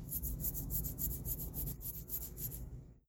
Sound effects > Human sounds and actions
HMNSkin-Samsung Galaxy Smartphone, MCU Head, Scratch Nicholas Judy TDC
A head scratch.
head, foley, scratch, Phone-recording